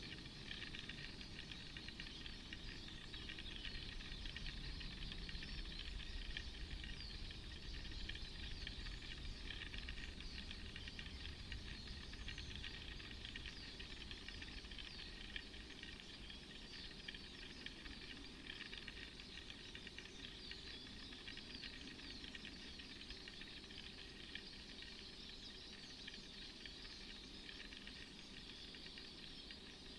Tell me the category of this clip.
Soundscapes > Nature